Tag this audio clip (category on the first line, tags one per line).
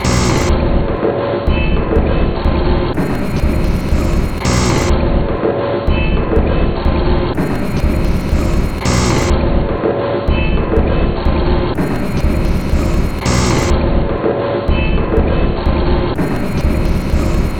Instrument samples > Percussion
Dark
Alien
Loopable
Loop
Drum
Ambient
Soundtrack
Industrial
Weird
Samples
Packs
Underground